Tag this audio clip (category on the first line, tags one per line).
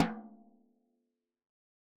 Music > Solo percussion
tom
studio
oneshot
drum
tomdrum
rim
fill
roll
drums
acoustic
kit
beatloop
percussion
beats
beat
floortom
rimshot
drumkit
perc
percs
instrument
toms
velocity
flam